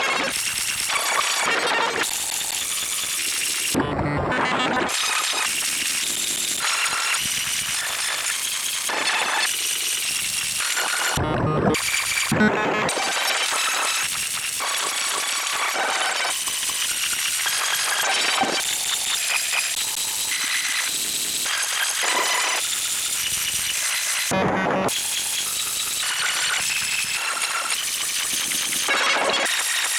Soundscapes > Synthetic / Artificial
Sample used from ''Phaseplant Factory Samples'' Used multiple Fracture to modulate it. Processed with Khs Phaser, ZL EQ, Fruity Limiter, Vocodex.